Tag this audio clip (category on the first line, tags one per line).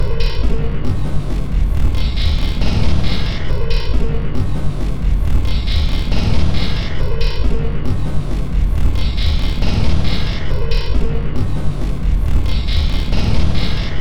Instrument samples > Percussion
Soundtrack
Packs
Samples